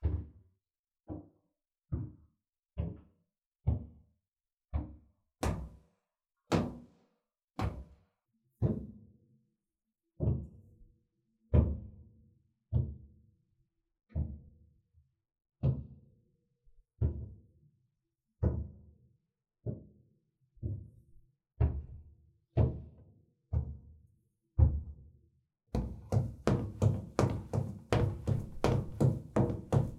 Sound effects > Human sounds and actions
Walking and running on a steel floor (sound samples)
The sound of footsteps in a steel bathtub. Footsteps on metal. Mix the audio together to create different walk and run cycles. Intended video game to simulate running on a steel catwalk or deckplates. But you could also edit some of the more intense steps for a hitting metal sound effect.
industrial, run, metal, scifi, videogame, walk